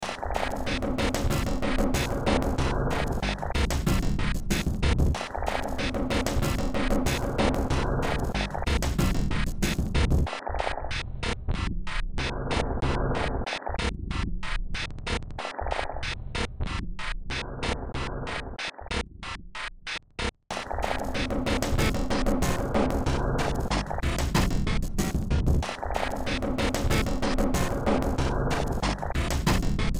Music > Multiple instruments
Demo Track #3734 (Industraumatic)
Ambient, Underground, Soundtrack, Horror, Noise, Industrial, Sci-fi, Games, Cyberpunk